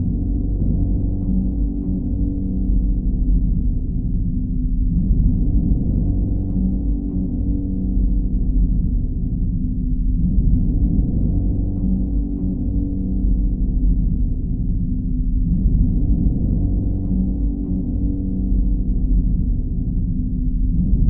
Soundscapes > Synthetic / Artificial
This 91bpm Ambient Loop is good for composing Industrial/Electronic/Ambient songs or using as soundtrack to a sci-fi/suspense/horror indie game or short film.
Alien, Loop, Loopable, Weird